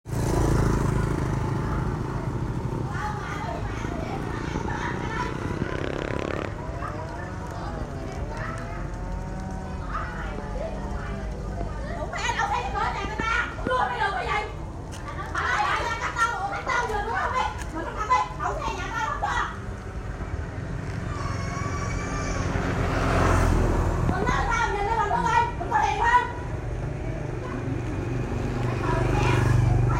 Speech > Conversation / Crowd
Women Argue In Street
Women argue. Record use iPhone 7 Plus smart phone. 2026.01.22 17:15